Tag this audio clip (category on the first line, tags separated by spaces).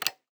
Sound effects > Human sounds and actions
toggle switch button activation interface off click